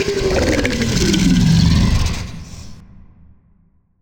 Sound effects > Experimental
Creature Monster Alien Vocal FX (part 2)-070
bite, weird, growl, demon